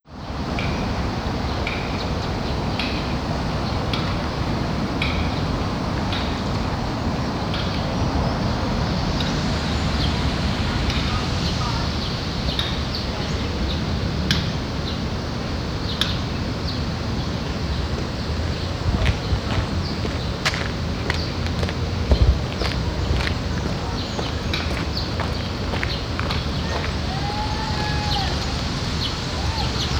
Soundscapes > Urban
050 DMBPARK AMBIENT BIRDS TRAFFIC PEOPLE FARAWAY-FOUNTAIN
birds, park, ambient, traffic, fountain, people